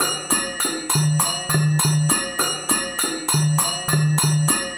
Music > Other
remix musique de moine 01
india, loop, percussion, rhythm, world